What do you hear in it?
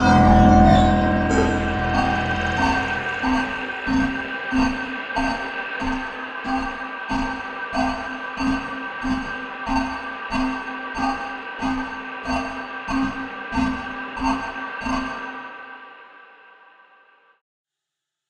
Instrument samples > Synths / Electronic
Synth Ambient Pad note C4 #003
Synth ambient pad with a slow atmospheric pad sound. Note is C4